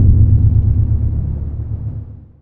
Instrument samples > Synths / Electronic
CVLT BASS 148
synthbass, synth, drops, lowend, subwoofer, bassdrop, bass, sub, wobble, low, stabs, subbass, wavetable, lfo, clear, subs